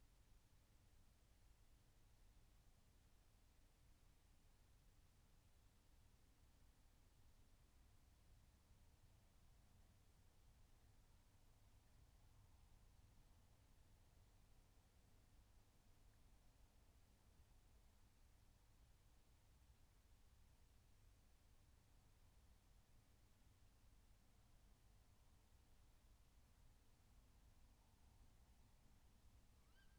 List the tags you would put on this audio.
Soundscapes > Nature
raspberry-pi meadow natural-soundscape alice-holt-forest nature

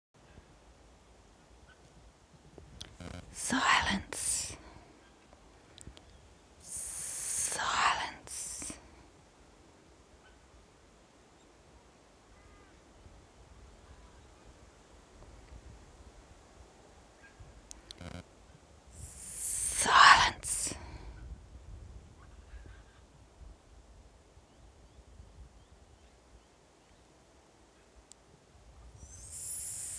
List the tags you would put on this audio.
Soundscapes > Nature
wind Mildura soundscape

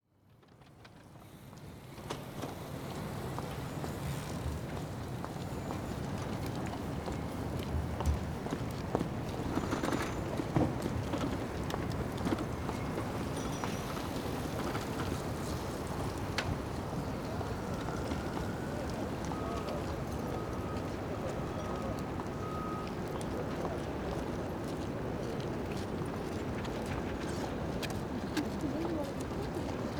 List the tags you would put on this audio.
Soundscapes > Urban
walking Dominique-Blais bridge human bikes Nantes work voyage morning bike pedestrian walk city traffic people field-recording street

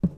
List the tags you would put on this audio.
Sound effects > Objects / House appliances
pour liquid slam clatter lid carry bucket pail plastic metal cleaning knock drop shake tip clang foley scoop debris fill object tool spill container

